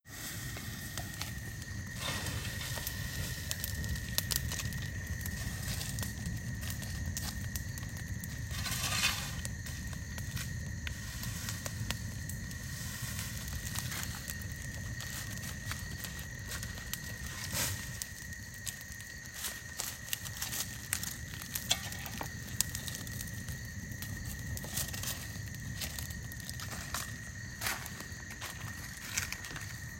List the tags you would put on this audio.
Soundscapes > Nature
australia
birds
bush
campfire
cough
field-recording
fire
footsteps
insects
outdoors
repellant
shovel
spray
tending

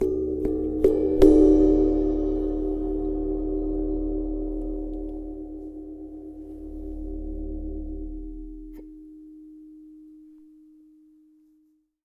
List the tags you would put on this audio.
Music > Solo instrument
Kit
Metal
Percussion
Drums
Cymbal
Cymbals
Ride
Oneshot
Drum
Paiste
22inch
Custom
Perc

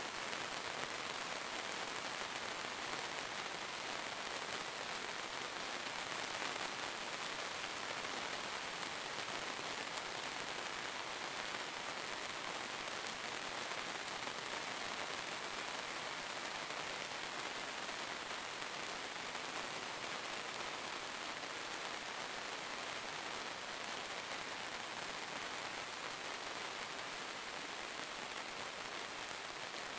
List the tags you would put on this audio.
Soundscapes > Nature
Night
France
rain
green-house
weather
MS
field-recording
rain-shower
windless
H2N
plastic
Bourgogne-Franche-Comte
Mid-side
Zoom-H2N
April
Rural
Cote-dor
Gergueil
over-night
2025
21410
country-side
raining

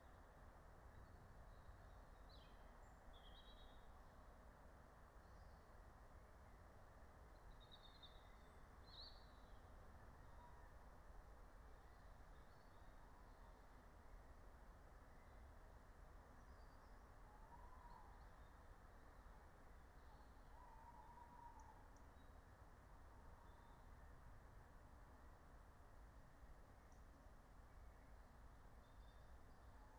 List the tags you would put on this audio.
Soundscapes > Nature
soundscape
raspberry-pi
phenological-recording
nature

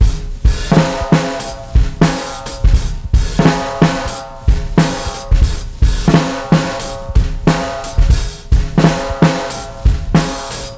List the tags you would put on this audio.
Music > Solo percussion
89BPM
Acoustic
Break
Breakbeat
Drum
DrumLoop
Drums
Drum-Set
Dusty
Lo-Fi
Vintage
Vinyl

